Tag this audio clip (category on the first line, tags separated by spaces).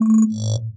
Sound effects > Electronic / Design
alert button Digital Interface menu message notification options UI